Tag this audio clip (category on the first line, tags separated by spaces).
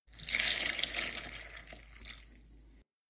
Sound effects > Objects / House appliances
foley Phone-recording breakfast cereal pour milk